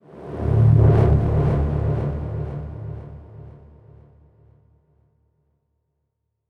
Sound effects > Electronic / Design
Deep Bass Transition SFX
Dark ambient deep bass transition sound effect made with Serum 2. Attack: 1s
dark, deep, effect, fx, horror, soundesign, space, transition